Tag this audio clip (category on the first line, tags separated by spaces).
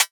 Instrument samples > Synths / Electronic
fm surge synthetic